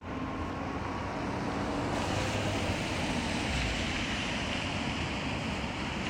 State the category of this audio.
Soundscapes > Urban